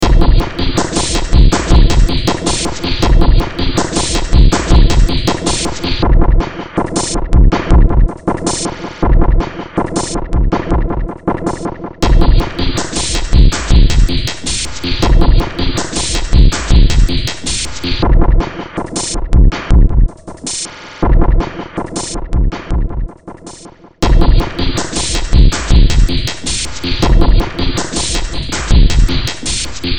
Music > Multiple instruments
Short Track #3921 (Industraumatic)
Ambient, Cyberpunk, Games, Horror, Industrial, Noise, Sci-fi, Soundtrack, Underground